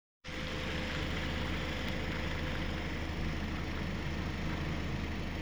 Sound effects > Other mechanisms, engines, machines
clip auto (21)
Auto, Avensis, Toyota